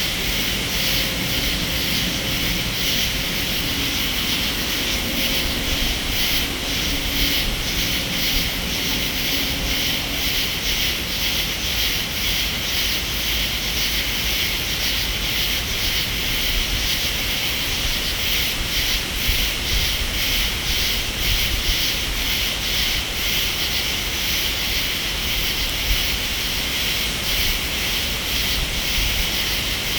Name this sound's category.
Soundscapes > Urban